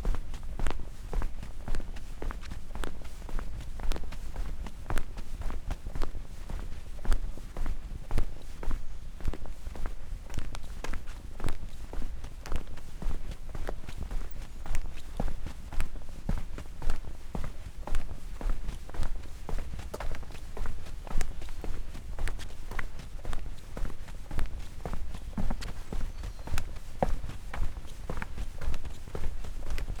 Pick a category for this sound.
Sound effects > Human sounds and actions